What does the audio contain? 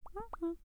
Sound effects > Other
start code
It's a sound created for the game Dungeons and Bubbles for The Global Game Jam 2025.
code, star, game, bubble, menu